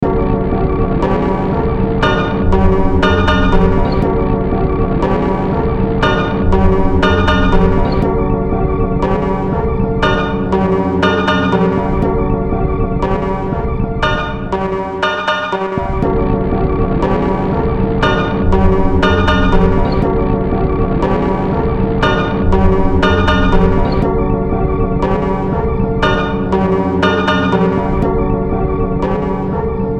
Music > Multiple instruments
Demo Track #3374 (Industraumatic)
Ambient, Cyberpunk, Games, Horror, Industrial, Noise, Sci-fi, Soundtrack, Underground